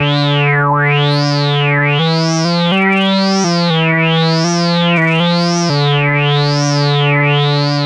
Music > Solo instrument
122 C SX1000 Loop 01
Synth Pad Loop made using Jen Synthetone SX1000 analog synth
80s, Analog, Analogue, Loop, Music, MusicLoop, Rare, Retro, Synth, SynthLoop, SynthPad, Texture, Vintage